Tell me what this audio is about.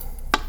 Sound effects > Objects / House appliances
knife and metal beam vibrations clicks dings and sfx-079
Metal, Klang, Trippy, Vibration, ding, FX, ting, Beam, Clang, Foley, Vibrate, Perc, metallic, Wobble, SFX